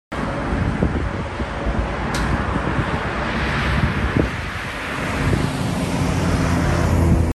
Sound effects > Vehicles
Sun Dec 21 2025 (6)
highway, car